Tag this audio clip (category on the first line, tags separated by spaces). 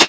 Instrument samples > Percussion
Meinl picocymbal dark-crisp Sabian crisp click drum